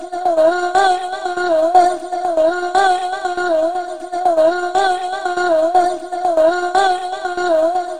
Sound effects > Human sounds and actions
Vox FX Melodix-120bpm
Vocal FX with chopped melodic tone, great for intros or drops.